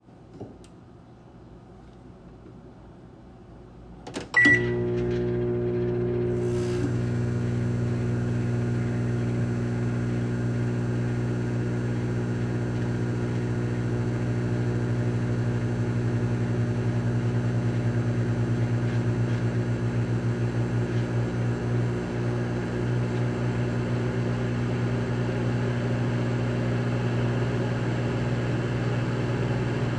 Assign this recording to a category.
Sound effects > Objects / House appliances